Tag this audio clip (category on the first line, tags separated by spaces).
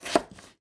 Sound effects > Other
Knife Home Cook Chop Cooking Vegetable Slice Quick Chef Chief Kitchen